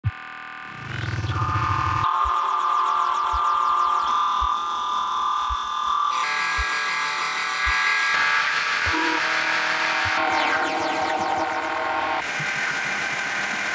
Sound effects > Electronic / Design
From Another Mother
Abstract, Alien, Analog, Automata, Buzz, Creature, Creatures, Digital, Droid, Drone, Experimental, FX, Glitch, Mechanical, Neurosis, Noise, Otherworldly, Robotic, Spacey, Synthesis, Trippin, Trippy